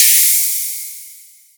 Instrument samples > Percussion
Magical Cymbal3
Cymbal, FX, Synthtic, Percussion, Magical, Enthnic